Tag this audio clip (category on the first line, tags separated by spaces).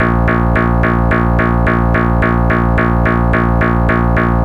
Music > Solo instrument
108bpm 1lovewav analog bass electro electronic loop synth